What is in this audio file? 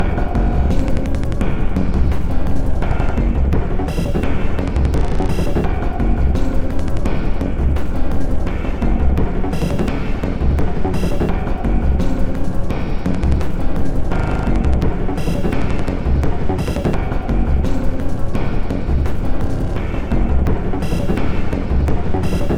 Instrument samples > Percussion
This 85bpm Drum Loop is good for composing Industrial/Electronic/Ambient songs or using as soundtrack to a sci-fi/suspense/horror indie game or short film.
Packs, Loop, Loopable, Industrial, Samples, Ambient, Underground, Weird, Drum, Alien, Soundtrack